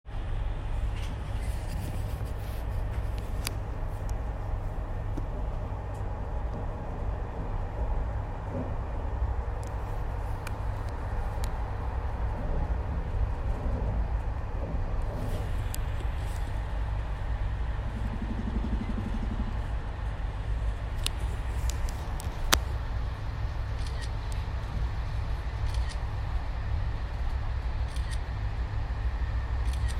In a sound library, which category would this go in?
Soundscapes > Indoors